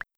Soundscapes > Nature
Created for the video game DystOcean, I made all sounds with my mouth + mixing.
Bubble, Bubbles, Pop, Water